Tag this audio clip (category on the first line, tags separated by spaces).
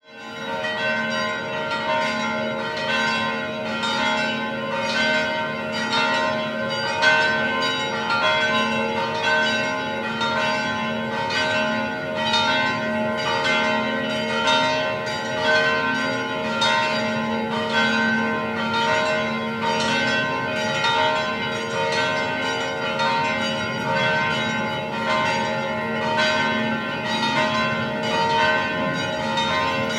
Soundscapes > Urban
nice bells